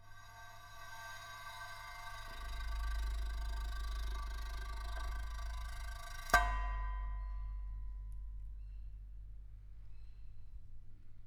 Sound effects > Other
Bowing metal newspaper holder with cello bow 2
Bowing the newspaper holder outside our apartment door. It's very resonant and creepy.
bow, effect, fx, metal, scary